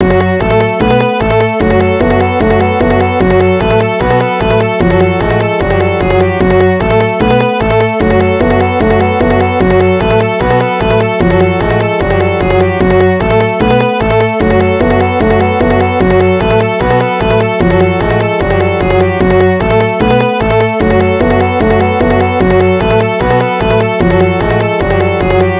Music > Multiple instruments
This is made in furnace tracker, 150bpm, Instruments: Violin, French horn. Others: Knock
Loop, Music